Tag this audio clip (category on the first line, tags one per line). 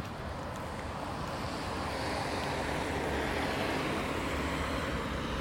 Soundscapes > Urban
car
tampere
vehicle